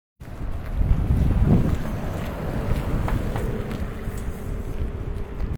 Sound effects > Vehicles
A bus passes by
bus, bus-stop, Passing